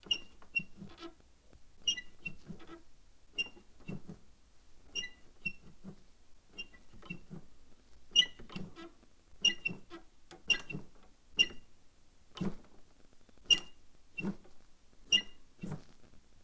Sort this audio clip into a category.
Sound effects > Objects / House appliances